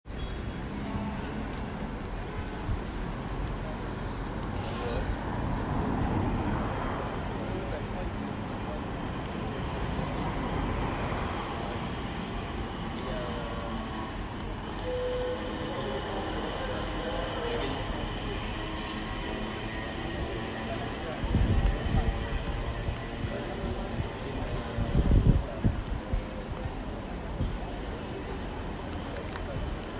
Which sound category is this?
Soundscapes > Urban